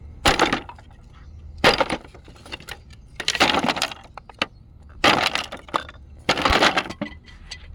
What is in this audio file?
Sound effects > Objects / House appliances
Destroying Wood Frame
Wood bedframe being thrown and crushed recorded on my phone microphone the OnePlus 12R
crash, crush, destroy, wood